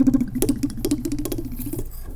Sound effects > Objects / House appliances
knife and metal beam vibrations clicks dings and sfx-111
Beam, Clang, Foley, FX, Metal, metallic, Perc, SFX, ting, Trippy, Vibration, Wobble